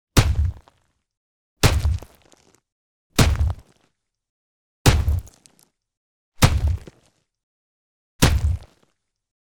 Sound effects > Animals
custom lizard insomiac inspired footstep 06252025
custom sound of insomiac inspired lizard footstep sound.
animal, animalistic, bass, beast, creature, demon, designed, dinosaur, dragon, evil, fantasy, foot, footstep, giant, gigantic, heavy, huge, insomiac, lizard, medieval, monster, monsterous, mutant, prehistoric, spiderman, step, stomp, thump, walk